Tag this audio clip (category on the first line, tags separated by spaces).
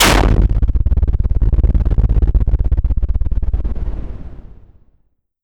Sound effects > Electronic / Design
explosion lingering reverbed bang noisy gunshot weapon